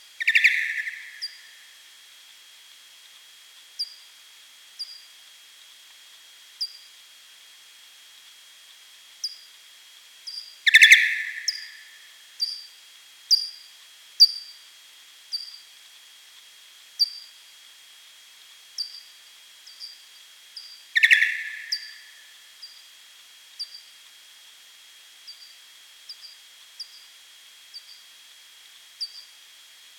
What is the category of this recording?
Soundscapes > Nature